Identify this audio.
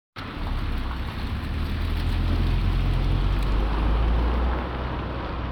Sound effects > Vehicles
vw passat 2010
Sound of a combustion engine car passing, captured in a parking lot in Hervanta in December. Captured with the built-in microphone of the OnePlus Nord 4.
field-recording, Tampere, Car